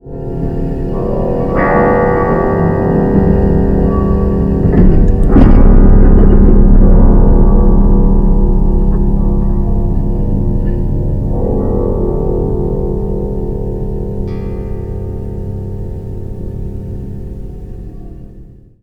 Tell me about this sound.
Soundscapes > Nature
Here are a few edits from a long recording (12 hour) of storm Bert November 2024 here in central Scotland. The sounds are both the wind swelling on the harp in addition to the rain hitting the strings of my DIY electric aeolian harp. THis is a selection of short edits that reflect the more interesting audio moments captured.